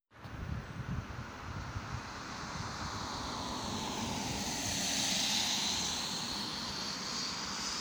Sound effects > Vehicles
tampere car20
car, vechicle, automobile